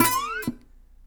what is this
Music > Solo instrument
acoustic guitar slide15
acosutic, chord, chords, dissonant, guitar, instrument, knock, pretty, riff, slap, solo, string, strings, twang